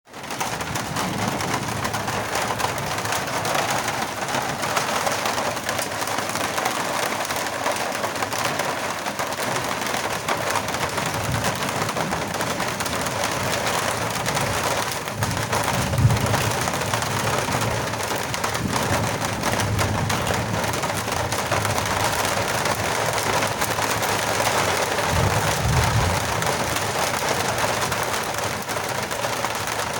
Soundscapes > Nature
I Recorded this audio when there was a heavy rain, under h plastic cover ( carfull, loud sound at the end !!)